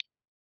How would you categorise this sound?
Sound effects > Objects / House appliances